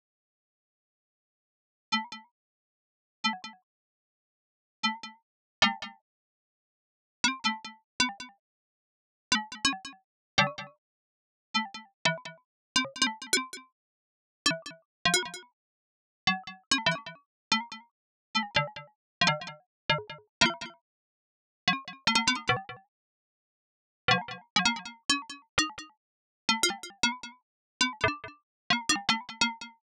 Soundscapes > Synthetic / Artificial
I experimented with sample and hole in Supercollider until I got this glitchy sounds reminding electronic droplets falling on the ground
algorithmic, digital, generative, processed, sound-design